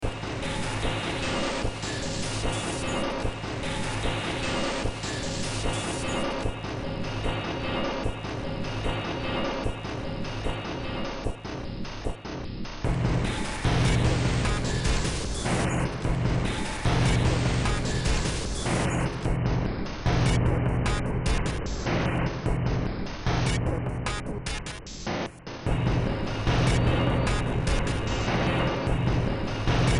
Music > Multiple instruments
Short Track #3328 (Industraumatic)
Soundtrack, Cyberpunk, Horror, Ambient, Sci-fi, Games